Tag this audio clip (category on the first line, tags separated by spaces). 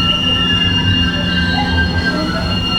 Soundscapes > Urban
MBTA screeching subway